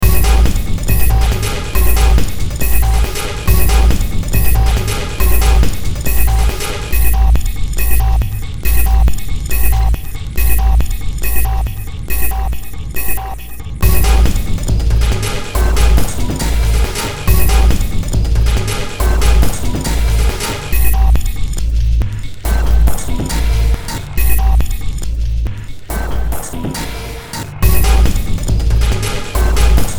Music > Multiple instruments
Short Track #3673 (Industraumatic)
Underground, Noise, Ambient, Horror, Cyberpunk, Industrial, Soundtrack, Games, Sci-fi